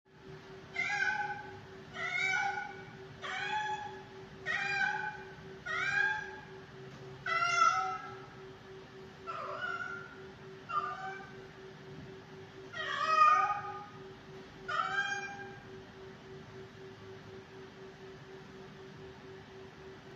Soundscapes > Indoors
Cat In Heat
I have a female cat that in heat. She meow loudly in my home. I record it using my phone.
animals, cat, meow